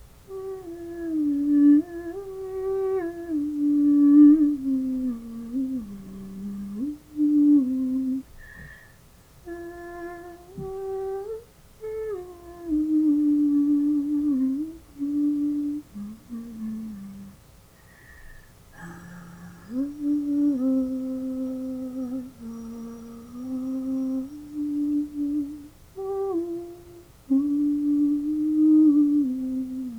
Music > Solo instrument
woman humming
A humming track I recorded in my room
voice, woman